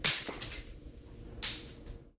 Sound effects > Objects / House appliances
ICEBrk-Samsung Galaxy Smartphone, CU Breaking, X2 Nicholas Judy TDC
Two ice breaks.
two, break, Phone-recording, shatter, ice